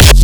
Instrument samples > Percussion
BrazilFunk Kick 11 Polished
Distorted, BrazilianFunk, Kick, Brazilian, BrazilFunk